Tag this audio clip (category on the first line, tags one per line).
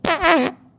Sound effects > Other
fart
gas
flatulence